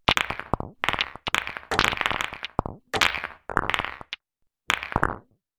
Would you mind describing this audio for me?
Sound effects > Other mechanisms, engines, machines
This collation of lawn bowls and billiard balls was used as distant atmosphere to indicate the resetting of an end in a game of Lawn Bowls. The sources are all unencumbered and / or fabricated with audacity. This FX is part of a Lawn Bowls Contact Sounds set that I thought may be useful. They were not intended as foreground FX but rather as emphasis and atmosphere. (Foley work more or less) The original mics are not relevant but included a Motorola phone and a condenser mic. The intent (technically) was close mic.